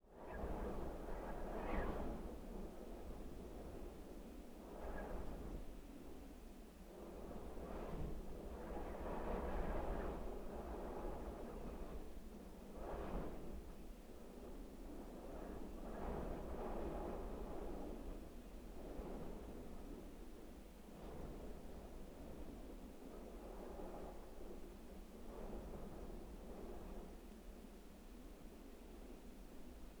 Soundscapes > Nature
Recorded in the ruins of an old haunted windmill on the island of Tinos, Greece.
creepy, sinister